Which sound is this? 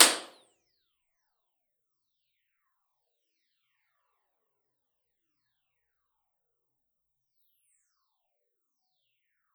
Sound effects > Other
Bathroom impulse response 1
Impulse response of the main bathroom in my house. Medium, tile walls and floor. (Speaker was placed in the shower box.)
bathroom, convolution, ir, reverb, reverberation